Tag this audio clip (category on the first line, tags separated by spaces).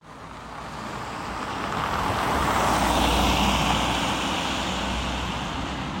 Soundscapes > Urban
traffic vehicle car